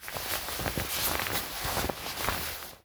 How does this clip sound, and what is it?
Sound effects > Objects / House appliances
cloth-foley-3
cloth, clothes, clothing, fabric, foley, jacket, movement